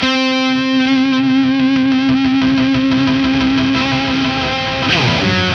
Instrument samples > String
Distorted guitar note sustained to chord
heavy, electric-guitar, overdrive, metal, power-chord, rock, distorted, distortion, fuzz, electric, guitar